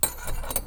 Sound effects > Objects / House appliances
knife and metal beam vibrations clicks dings and sfx-034
Klang, FX, ting, Beam, ding, metallic, Trippy, SFX, Clang, Vibration, Foley, Wobble, Metal, Perc, Vibrate